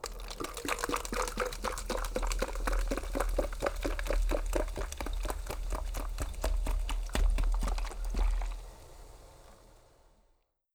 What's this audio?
Sound effects > Objects / House appliances
FOODPour-Blue Snowball Microphone, CU Soda Nicholas Judy TDC
A soda pouring.
Blue-brand, Blue-Snowball, foley, pour, soda